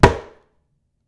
Sound effects > Objects / House appliances
Box Hit
Me hitting an empty tissue box right side up on the bathroom counter. Recorded with a Audio-Technica AT2500x and trimmed in Audacity.
Sudden, Tissue-Box